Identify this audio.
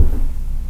Sound effects > Objects / House appliances
knife and metal beam vibrations clicks dings and sfx-046
Beam, Clang, ding, Foley, FX, Klang, Metal, metallic, Perc, SFX, ting, Trippy, Vibrate, Vibration, Wobble